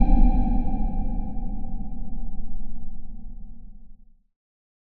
Sound effects > Electronic / Design
009 LOW IMPACT
IMPACTS, LOW, PUNCH, RUMBLING, HIT, BASS, BACKGROUND, RUMBLE